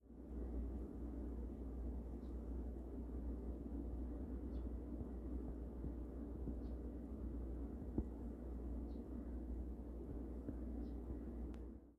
Soundscapes > Indoors
BIRDSong-Samsung Galaxy Smartphone, Distant Northern Mockingbird, Florida Nicholas Judy TDC
A northern mockingbird in the distance. Recorded in Orlando, Florida.
distant, arkansas, northern-mockingbird, tennessee, distance, florida, mississippi, orlando, texas, Phone-recording